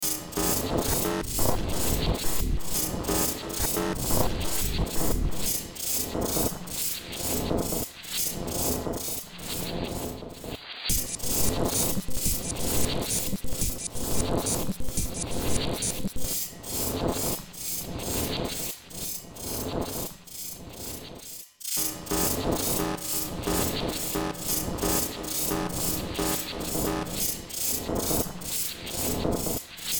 Music > Multiple instruments
Short Track #3848 (Industraumatic)
Ambient, Cyberpunk, Games, Horror, Industrial, Noise, Sci-fi, Soundtrack, Underground